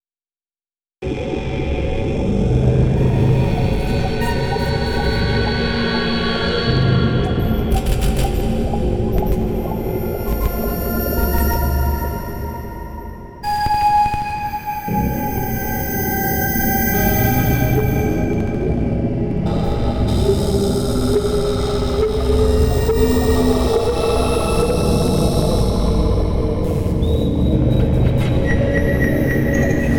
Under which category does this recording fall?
Sound effects > Other